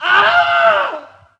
Sound effects > Human sounds and actions
Wilhelm Scream Alternative 2
death, fall, falling, famous, killed, legend, legendary, male, man, meme, pain, scream, screaming, shout, shouting, wilhelm, wilhelmscream, Wilhelm-Scream, willhelm, willhelm-scream, willhelmscream